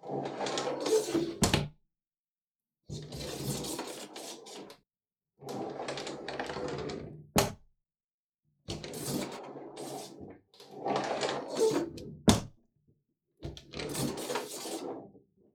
Sound effects > Objects / House appliances
A recording of a wooden, bathroom door being opened and closed in a Flat. Edited in RX11. Recorded using Zoom F3. Rode NTG4. Dual Mono.
bathroom, closing, door, Home, House, opening, sliding, wood